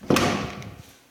Sound effects > Objects / House appliances
Pool door opening. Recorded with my phone.